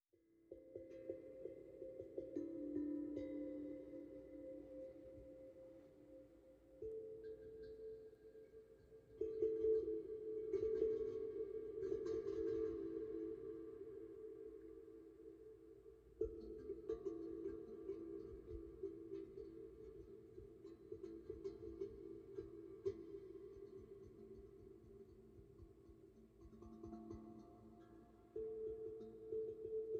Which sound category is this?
Music > Solo percussion